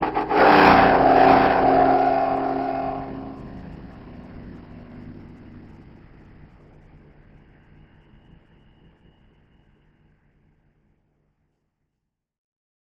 Sound effects > Objects / House appliances
Scraping and bowing metal sheets